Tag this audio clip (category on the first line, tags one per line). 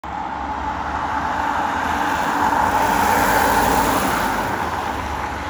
Soundscapes > Urban
Drive-by
field-recording